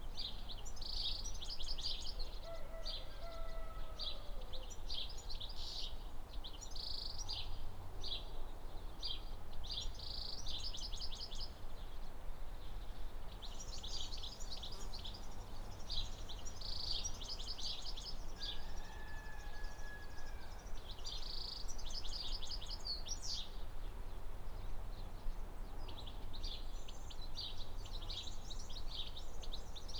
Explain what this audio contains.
Soundscapes > Urban
AMBRurl Idle countryside road with chirping birds and a rooster, Makarewa, New Zealand
Recorded 09:56 25/12/25 A road near a tree plantation in the countryside. Only one car passes on the road, though a highway is a bit further away. Insects flying past occasionally. There's plenty of birds chirping throughout such as chaffinches, sparrows, and a goldfinch in the beginning, also a rooster calling. Zoom H5 recorder, track length cut otherwise unedited.